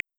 Sound effects > Other mechanisms, engines, machines
MECHClik-Samsung Galaxy Smartphone, CU Barcode Scanner Click Nicholas Judy TDC
A barcode scanner clicking. Recorded at Lowe's
click, Phone-recording, barcode-scanner, foley